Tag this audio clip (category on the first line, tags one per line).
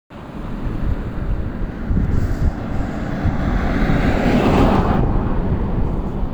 Sound effects > Vehicles
vehicle
traffic
car